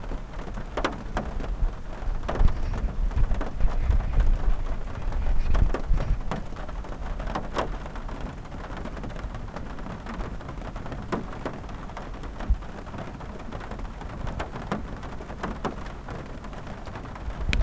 Soundscapes > Nature
Light Autumn Rain on the Metal Roof of the Car #001
The sound of light autumn rain on the metal roof of the car